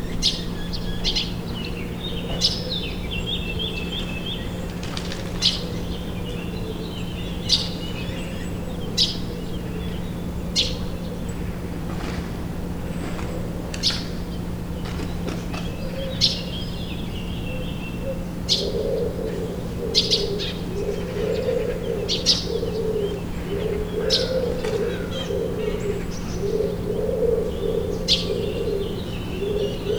Sound effects > Animals
Subject : Recording birds in front of my residency. Focusing on a small one about 10m away, he's the loudest peaks in the recording. Date YMD : 2025 July 02 06h15 Location : Albi 81000 Tarn Occitanie France. Sennheiser MKE600 with stock windcover P48, no filter. Weather : Small wind/breeze of air, grey sky 22° 84% humidity. Processing : Trimmed in Audacity. Notes : Tips : With the handheld nature of it all. You may want to add a HPF even if only 30-40hz.
Sennheiser, 81000, Single-mic-mono, France, Shotgun-mic, Shotgun-microphone, MKE600, July, Tarn, MKE-600, Hypercardioid, Tascam, morning, Occitanie, Albi, Bird, FR-AV2, City